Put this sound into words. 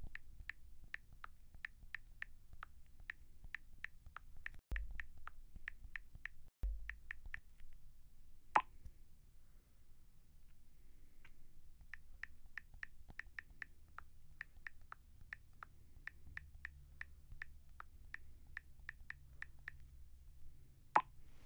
Sound effects > Objects / House appliances
Cel Phone iPhone typing dialling sound Humdrum
Sound of dialling on an iPhone 14